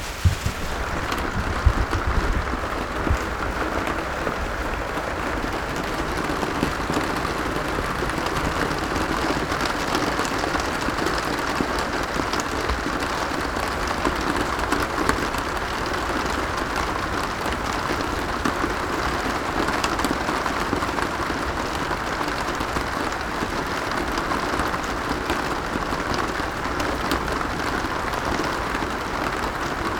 Sound effects > Natural elements and explosions
Heavy Rain with water dripping on a saturated coy mat recorded with a Zoom H6